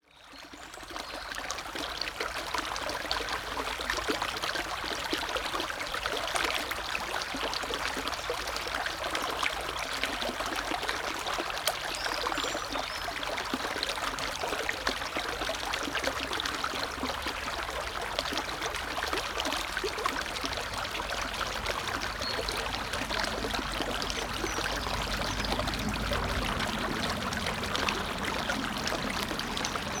Soundscapes > Nature
A recording of water flowing through a small stream in a residential area.